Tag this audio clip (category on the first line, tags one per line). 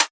Instrument samples > Synths / Electronic
surge
electronic
synthetic
fm